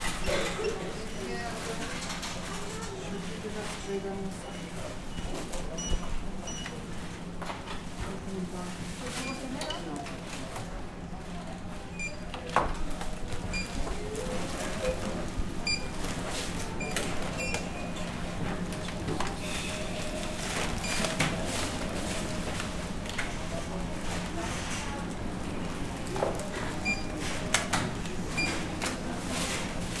Soundscapes > Indoors
GM Supermarket France
Recording of a supermarket in south of France, close to the cashier with a Zoom H5.
Cashier, Supermarket, Beep, France